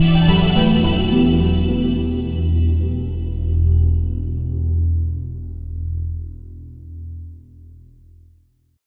Music > Multiple instruments
Healing (Total Rejuvenation) 2
restoration-spell, restore-health, heal-jingle, restore-jingle, heal-effect, restore-spell, heal-character, heal, health-restore, healing-effect, heal-spell, healing-jingle, healing, dylan-kelk, rpg-fx, rpg-heal, restoration-jingle, drink-potion, healing-spell, rpg-restore, use-item